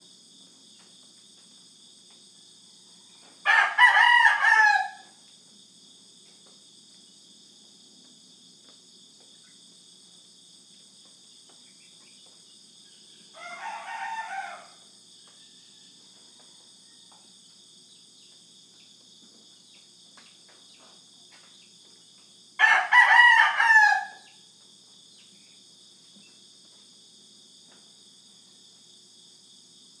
Sound effects > Animals
250727 051330 PH Roosters and crickets in the morning
Roosters and crickets in the morning. Recorded from the window of a house located in the surroundings of Santa Rosa (Baco, Oriental Mindoro, Philippines)during July 2025, with a Zoom H5studio (built-in XY microphones). Fade in/out and high pass filter at 180Hz -48dB/oct applied in Audacity.
chickens, soundscape, Santa-Rosa, farm, crickets, atmosphere, cock-a-doodle-doo, chicken, Philippines, ambience, outdoor, field-recording, insects, roosters, morning, countryside, rooster